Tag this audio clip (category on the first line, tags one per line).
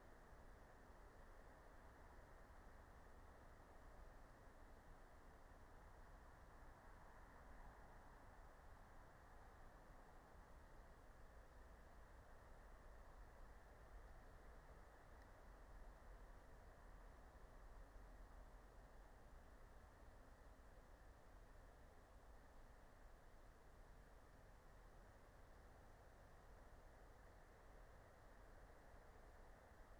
Soundscapes > Nature
field-recording
raspberry-pi
soundscape
natural-soundscape
phenological-recording
nature
alice-holt-forest
meadow